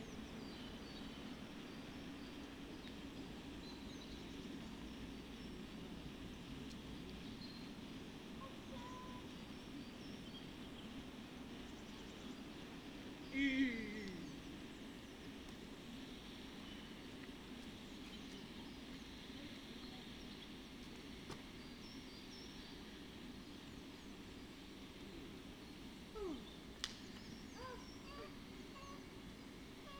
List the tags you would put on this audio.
Soundscapes > Nature
alice-holt-forest
artistic-intervention
data-to-sound
Dendrophone
field-recording
modified-soundscape
phenological-recording
raspberry-pi
sound-installation
soundscape
weather-data

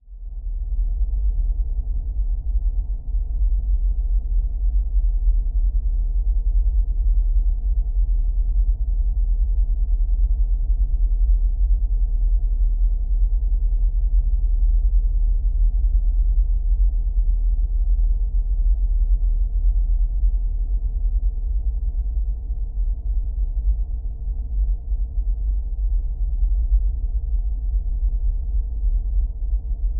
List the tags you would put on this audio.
Sound effects > Other mechanisms, engines, machines
bass
Ambient
Geofon
pad
Drone

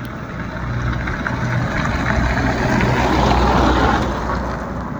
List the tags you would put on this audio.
Sound effects > Vehicles
automobile
car
vehicle

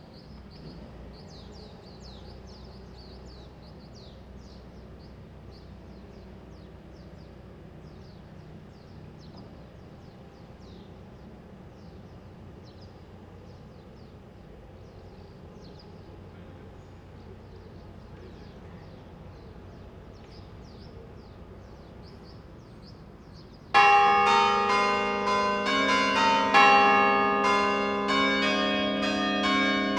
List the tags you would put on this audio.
Soundscapes > Urban
church-bell
MKE600
Morning
Occitanie

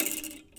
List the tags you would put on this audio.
Sound effects > Other mechanisms, engines, machines
sfx
sound
percussion
tools
tink
wood
little
boom
rustle
metal
bop
thud
knock
shop
pop
perc
foley
fx
bam
crackle
bang
oneshot
strike

